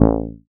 Instrument samples > Synths / Electronic
MEOWBASS 2 Db
fm-synthesis, bass